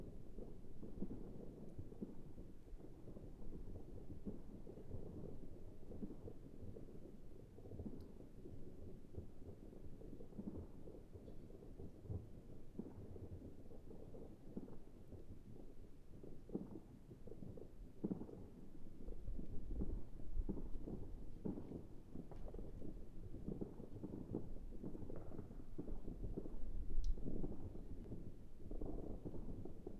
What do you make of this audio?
Soundscapes > Synthetic / Artificial
Ambience City DistantFireworks Part1
ambient, night, celebration, soundscape, background, fireworks, event, atmosphere, new-year, outdoor, distant, city, field-recording